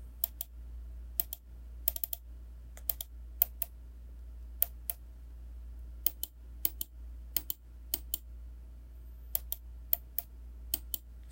Sound effects > Objects / House appliances
just some mouse clicks recorded on an iphone